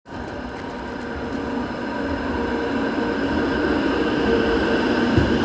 Sound effects > Vehicles
A tram passing by in Tampere, Finland. Recorded on an iPhone 16's built-in microphone. This clip is recorded for the COMP.SGN.120 Introduction to Audio and Speech Processing course project work in Tampere University.
public-transport, city, tram